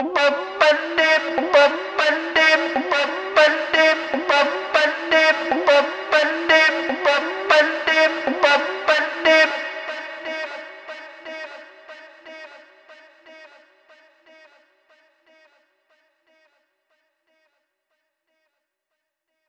Speech > Other
HELL SCREAM YELL
Man child screaming. Sound is a recording of vocals in my home studio.
snarl, growl, yell